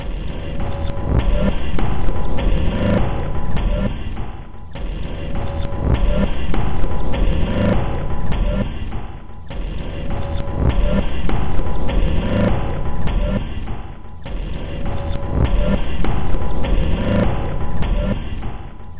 Instrument samples > Percussion
This 101bpm Drum Loop is good for composing Industrial/Electronic/Ambient songs or using as soundtrack to a sci-fi/suspense/horror indie game or short film.
Weird, Loopable, Alien, Underground, Loop, Ambient, Samples, Dark, Drum, Soundtrack, Industrial, Packs